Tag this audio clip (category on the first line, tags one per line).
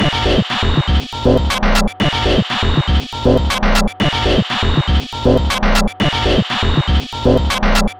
Instrument samples > Percussion
Samples
Drum
Weird
Loopable
Underground
Alien
Ambient
Loop
Industrial
Packs
Dark
Soundtrack